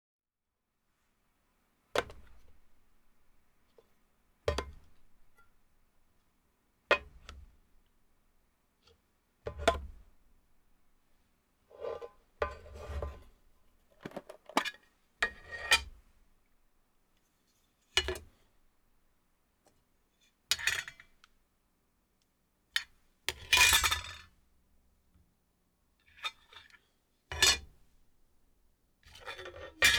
Sound effects > Objects / House appliances
OBJCont Small-Tin2 Desk
Recorder located above the desktop but attahced to the dekstop appox 2 feet away from the tin. Unclear if the low end is physical transfer through the desk/mount of just the echo/reverb from below the desk. I am leaning toweard the later given how small/lightweight the tin is.